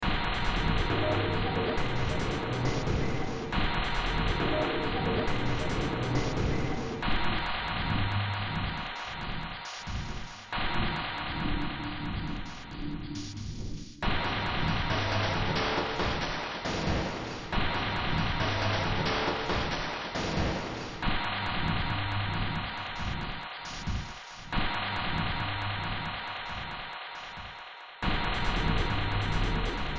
Music > Multiple instruments
Short Track #3733 (Industraumatic)
Games, Sci-fi, Underground, Industrial, Soundtrack, Ambient, Horror, Noise, Cyberpunk